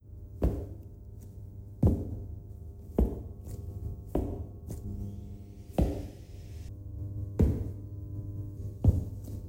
Sound effects > Natural elements and explosions
Walking on stone - KOA
walking, footsteps, stone